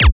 Instrument samples > Percussion
Frechcore Punch 2
Lazer tok synthed with Vital. Sample layered Grv kick 03 and 06, Minimal kick 55. Processed with ZL EQ and Waveshaper.